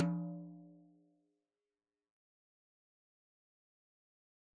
Music > Solo percussion
Hi Tom- Oneshots - 23- 10 inch by 8 inch Sonor Force 3007 Maple Rack
acoustic, beat, beatloop, beats, drum, drumkit, drums, fill, flam, hi-tom, hitom, instrument, kit, oneshot, perc, percs, percussion, rim, rimshot, roll, studio, tom, tomdrum, toms, velocity